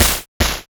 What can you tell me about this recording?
Instrument samples > Percussion
[CAF8bitV2]8-bit Snare1-D Key-Dry&Wet
8-bit, 8bit, Game, Snare